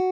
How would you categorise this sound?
Instrument samples > String